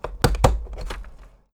Sound effects > Objects / House appliances
OBJOffc-Blue Snowball Microphone, CU Stapler, Staple Paper Nicholas Judy TDC
A stapler stapling a paper.
staple, Blue-Snowball, Blue-brand, paper, stapler, foley